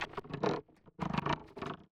Sound effects > Objects / House appliances
Short creak sound resulting from a moving or deformation of an old wooden door.